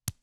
Sound effects > Experimental
Torturing onions. Can be useful to design punch sounds. Recorded with Oktava MK 102.